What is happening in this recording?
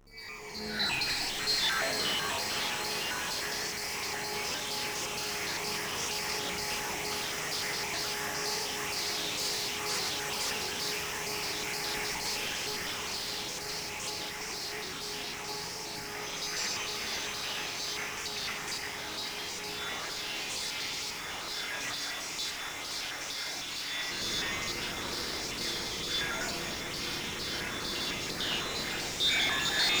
Sound effects > Electronic / Design
Melting Sparks 3
abstract, ambient, noise, noise-ambient